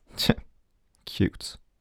Speech > Solo speech
Cocky - Tch cute
oneshot, Voice-acting, smug, voice, sarcastic, cocky, dialogue, talk, Mid-20s, FR-AV2, Tascam, Vocal, Human, singletake, Video-game, Neumann, Man, U67, word, Single-take, NPC, Male, sound